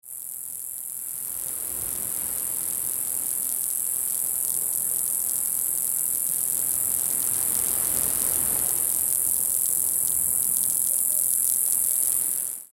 Soundscapes > Nature
Crickets chirping on a quiet beach
Crickets are chirping in Cahuita National Park in Costa Rica. In the background, gentle waves are rolling onto the shore. Recorded with an iPhone 12 Pro.
caribbean, cricket, crickets, field-recording, insects, jungle, nature